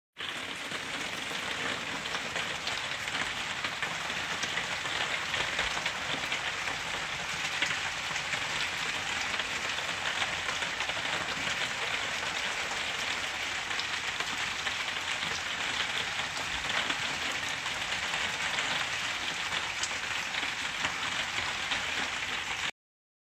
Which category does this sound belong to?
Sound effects > Natural elements and explosions